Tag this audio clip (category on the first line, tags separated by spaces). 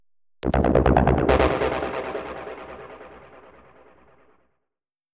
Instrument samples > Synths / Electronic
acid 140bmp techno bassline audacity ValhallaDelay flstudio